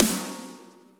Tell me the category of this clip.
Instrument samples > Percussion